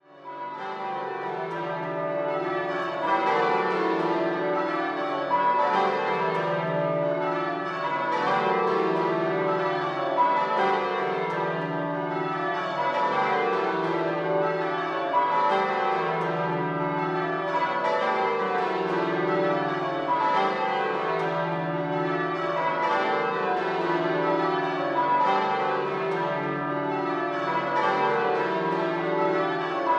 Soundscapes > Urban
A morning recording of the bells being rung at Lichfield Cathedral.
bells, church-bells, outdoors